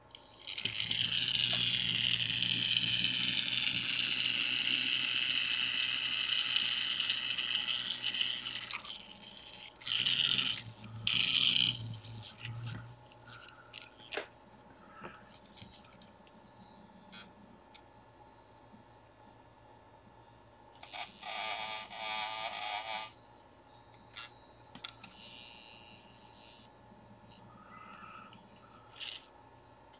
Sound effects > Objects / House appliances
Electrical arcs, sparks and zaps.